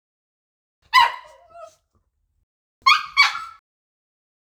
Sound effects > Animals
A sound effect of a Shih Tzu whining & shrieking. Could be useful for a certain project. No edits besides trimming clip and silencing parts on either side of sound. Made by R&B Sound Bites if you ever feel like crediting me ever for any of my sounds you use. Good to use for Indie game making or movie making. Get Creative!